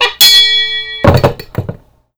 Sound effects > Other
TOONImpt-CU Honk, Bang, Fall Nicholas Judy TDC
A horn honk, bell clang and bodyfall.